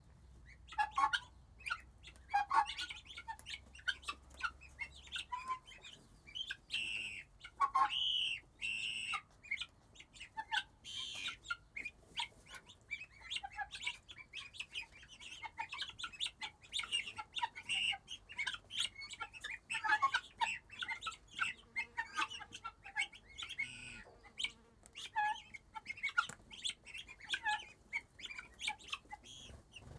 Soundscapes > Nature
Pintades Donzy Le National 2
A bunch of guinea fowls emitting their strange chuckles. Recorded at Donzy-Le-National, Bourgogne, France, may 2025 EM272 mics, mono-ish recording.
pintade, guinea-fowl, chuckle, farm